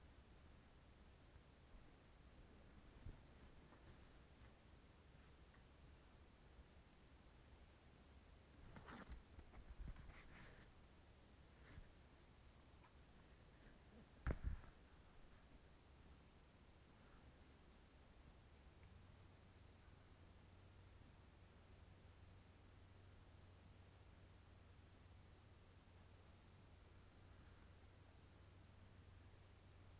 Sound effects > Human sounds and actions
My using my vibrator on my tight wet pussy
moan, orgasm, pussy, sex